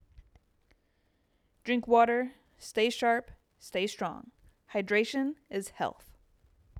Speech > Solo speech
A positive reminder about the importance of drinking enough water daily. Script: "Drink water. Stay sharp. Stay strong. Hydration is health."